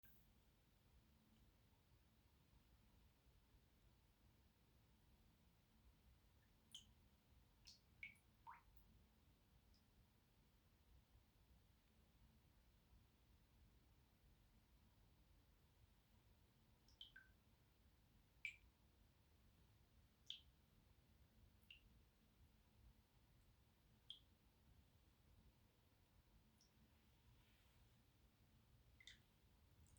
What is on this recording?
Sound effects > Objects / House appliances
Water Swishing & Drops
The drops and swishing of water in a bathroom
bath, bathroom, drip, drop, droplet, sink, swish, water